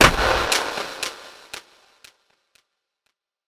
Sound effects > Experimental
snap crack perc fx with verb -glitchid 006
abstract, alien, clap, crack, edm, experimental, fx, glitch, glitchy, hiphop, idm, impact, impacts, laser, lazer, otherworldy, perc, percussion, pop, sfx, snap, whizz, zap